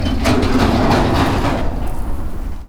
Sound effects > Objects / House appliances
Junkyard Foley and FX Percs (Metal, Clanks, Scrapes, Bangs, Scrap, and Machines) 57
Machine, SFX, rubbish, Foley, Percussion, scrape, Metallic, tube, dumpster, FX, Robotic, Clank, Dump, dumping, Junk, Atmosphere, Perc, Robot, Metal, rattle, waste, trash, Smash, Bang, Bash, Clang, Junkyard, Ambience, garbage, Environment